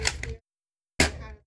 Sound effects > Objects / House appliances
TOYMisc-Samsung Galaxy Smartphone, CU Nerf Mega Hot Shock, Cock, Shoot Nicholas Judy TDC
A Nerf Mega Hot Shock cocking and shooting. Recorded at Goodwill.
cock, foley, mega-hot-shock, nerf, nerf-mega-hot-shock, Phone-recording, shoot